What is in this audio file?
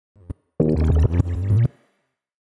Sound effects > Electronic / Design
Optical Theremin 6 Osc Shaper Infiltrated-017
Sounds from an Optical Theremin I built from scratch that uses 3 Main Oscillators all ring modded to one another , each Oscillator is connected to 2 Photoresistors and an old joystick from PS2 controllers. The sounds were made by moving the unit around my studio in and out of the sun light coming through the skylights. further processing was done with Infiltrator, Rift, ShaperBox, and Reaper
Alien, Analog, Chaotic, Crazy, DIY, EDM, Electro, Electronic, Experimental, FX, Gliltch, IDM, Impulse, Loopable, Machine, Mechanical, Noise, Oscillator, Otherworldly, Pulse, Robot, Robotic, Saw, SFX, strange, Synth, Theremin, Tone, Weird